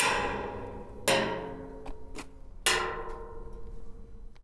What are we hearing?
Sound effects > Objects / House appliances
Junkyard Foley and FX Percs (Metal, Clanks, Scrapes, Bangs, Scrap, and Machines) 96
dumping, Machine, Bang, Perc, trash, tube, Smash, Junk, Foley, Atmosphere, Robot, Bash, rattle, Ambience, Environment, Clank, Robotic, dumpster, Metallic, SFX, scrape, rubbish, Clang, Metal, Junkyard, Percussion, Dump, FX, garbage, waste